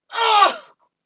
Speech > Solo speech
Soldier-DeathHit
just a simple death grunt
agony, battle, suffering, war